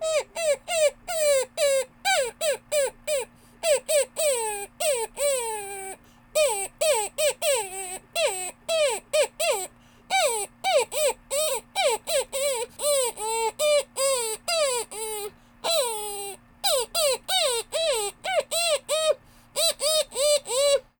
Sound effects > Animals
TOONAnml-Blue Snowball Microphone, MCU Dog Whining, Human Imitation, Cartoon, Almost Sounds Real Nicholas Judy TDC
A dog whining. Human imitation. Cartoon. Sounds almost real.
Blue-brand, Blue-Snowball, cartoon, dog, human, imitation, whine